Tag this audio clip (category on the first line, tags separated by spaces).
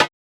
Instrument samples > Synths / Electronic
synthetic fm electronic